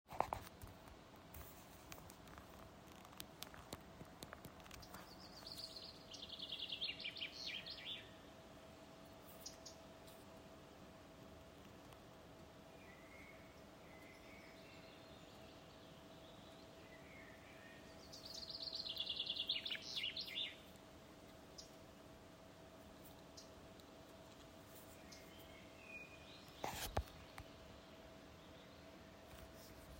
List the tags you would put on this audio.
Soundscapes > Nature
wilderness ambience solstice birds nature afternoon wind scandinavia field-recording spring forest

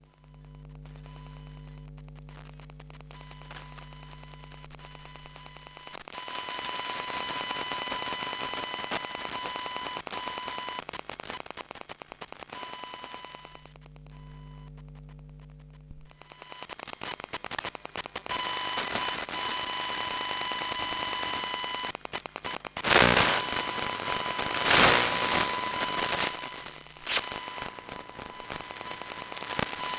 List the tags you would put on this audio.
Sound effects > Objects / House appliances
coil electric electrical electromagnetic field field-recording iphone magnetic noise phone pick-up pickup

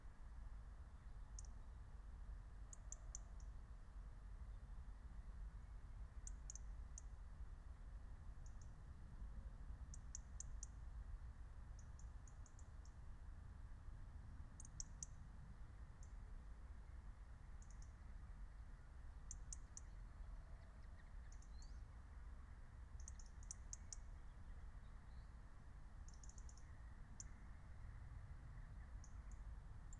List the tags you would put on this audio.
Soundscapes > Nature
alice-holt-forest natural-soundscape phenological-recording soundscape field-recording nature meadow

